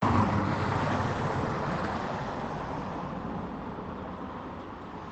Sound effects > Vehicles
car passing near
Car traveling at approx. 60+ km/h passing by on a wet paved highway at approx. 10 meters away. Recorded in an urban setting in a near-zero temperature, using the default device microphone of a Samsung Galaxy S20+.
car, highway